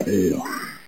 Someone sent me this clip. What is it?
Speech > Solo speech
GORY AUDIO
retro, games, 8-bit, Gory, arcade, gaming, speech